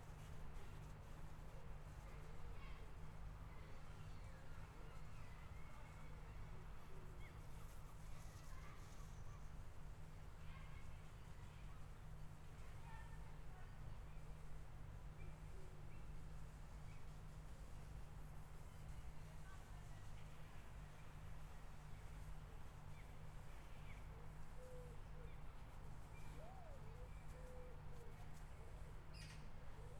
Soundscapes > Urban
AMBPark People, birds, and park work in the urban old cemetery, Malmö, Sweden
Recorded 09:51 18/07/25 In the old cemetery in the middle of Malmö. People walk on the gravel paths such as a family. There’s park work including a person cleaning a lawn mower. It then starts to get driven into the shed. Pigeons, seagulls, magpies and a far off crow sound. Traffic ambience from the streets are heard. At the end of the recording a branch falls on the tree above and lands right in front of the microphone. Zoom H5 recorder, track length cut otherwise unedited.
Ambience, City, Crow, Doves, Flying, Gravel, Graveyard, Magpie, Malm, Morning, Park, Path, People, Sweden, Urban